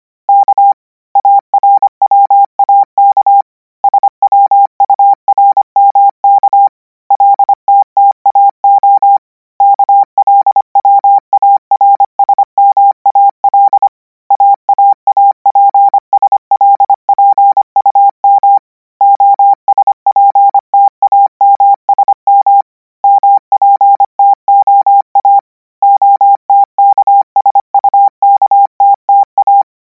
Sound effects > Electronic / Design
Koch 11 KMRSUAPTLOW - 420 N 25WPM 800Hz 90%
Practice hear characters 'KMRSUAPTLOW' use Koch method (after can hear characters correct 90%, add 1 new character), 400 word random length, 25 word/minute, 800 Hz, 90% volume.
characters, codigo, code, morse, radio